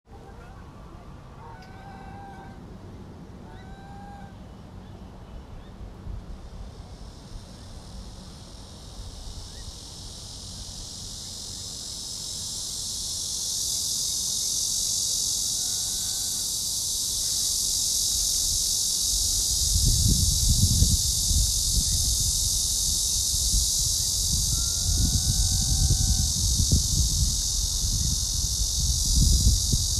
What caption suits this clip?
Soundscapes > Urban
Cicadas and gooses
Recorded in a park in Suzhou, China yesterday. You can hear the cicadas on the trees and the gooses by the pond.
birds, insects, nature, summer